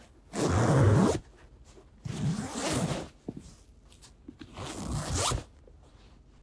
Sound effects > Human sounds and actions
Jacket zipper going up and down.